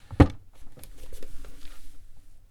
Sound effects > Objects / House appliances
Wooden Drawer 05
open, drawer, wooden